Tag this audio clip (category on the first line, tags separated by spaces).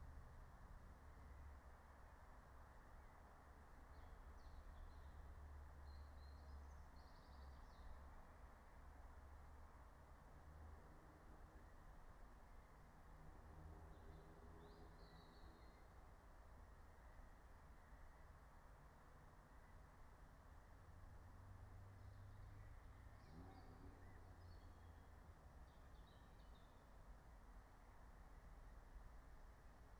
Soundscapes > Nature
nature phenological-recording raspberry-pi soundscape field-recording natural-soundscape alice-holt-forest meadow